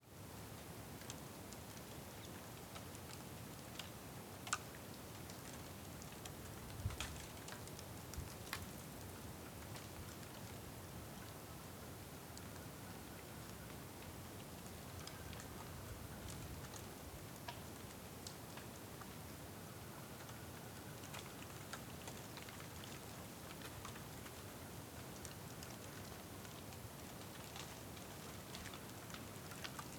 Soundscapes > Nature
ambience 1 day mountain winter snow
field recording on mountain daytime, recorded with zoom h6
winter, mountain